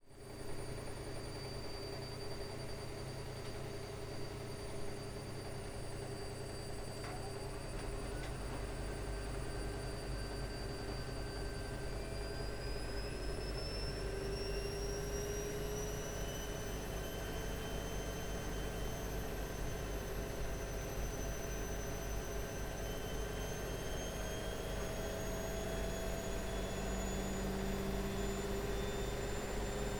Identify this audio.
Sound effects > Objects / House appliances
Washing machine centrifuge 2
Our washing machine doing its water-extraction business on clothes being laundered.
washer, laundry